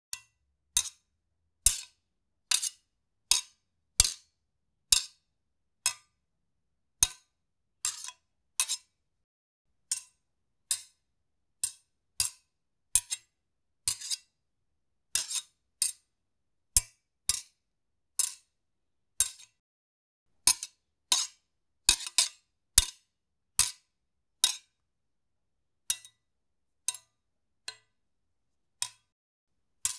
Sound effects > Objects / House appliances
fencing sword knife hits Heavens feel inspired 11072025
sound recordings of carving knife hitting against bread knife. 1st batch is original 2nd slight slow speed 3rd and final batch, EQ filtered.